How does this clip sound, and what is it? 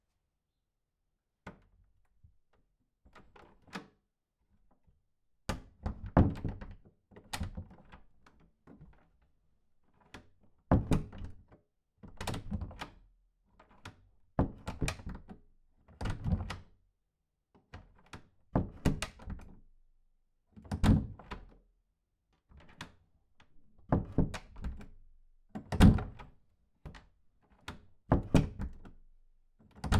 Sound effects > Objects / House appliances
Interrior wooden door metal handle opening and closing (multi-take)
Subject : Multiple takes of operating a door handle. Date YMD : 2025 04 19 Location : Indoor Gergueil France. Hardware : Tascam FR-AV2, Rode NT5 XY Weather : Processing : Trimmed and Normalized in Audacity.
indoor, close, Dare2025-06A, NT5, hinge, 2025, FR-AV2, Tascam, XY, open, Door, Rode, handle